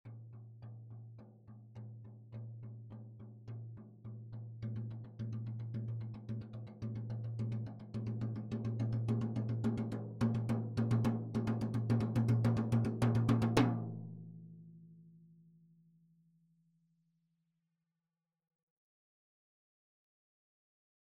Music > Solo percussion
med low tom-loose beat build up 12 inch Sonor Force 3007 Maple Rack
acoustic, beat, drum, drumkit, drums, flam, kit, loop, maple, Medium-Tom, med-tom, oneshot, perc, percussion, quality, real, realdrum, recording, roll, Tom, tomdrum, toms, wood